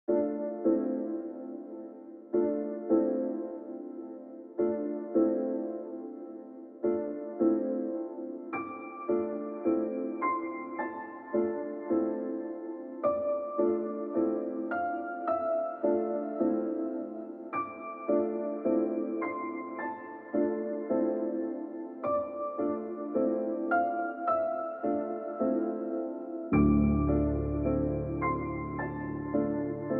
Music > Multiple instruments
mystery+puzzle music - piano version (no drums)
Inspired by the music from various mystery games I set out to create my own. This version uses only the background piano to make it more suitable for background music. Failing to comply will result in your project, any type, being taken down.
piano, dreamy, swelling, mysterious, Suspenseful, Foreboding, loop